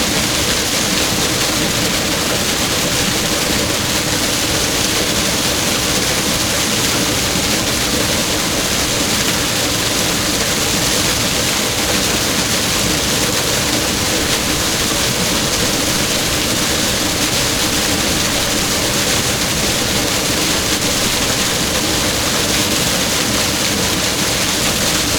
Sound effects > Natural elements and explosions
250629 Albi pontvieux waterfall - MKE600
Subject : Water output falling into the river. Next to the "Pont vieux". Date YMD : 2025 June 29 Sunday Morning (07h30-08h30) Location : Albi 81000 Tarn Occitanie France. Sennheiser MKE600 with stock windcover P48, no filter. Weather : Sunny no wind/cloud. Processing : Trimmed in Audacity. Notes : There’s “Pause Guitare” being installed. So you may hear construction work in the background. Tips : With the handheld nature of it all. You may want to add a HPF even if only 30-40hz.
2025, 81000, Albi, City, Early-morning, falling, France, Hypercardioid, June, man-made, MKE600, Morning, Occitanie, Outdoor, Shotgun-mic, Sunday, Tarn, urbain, water, water-fall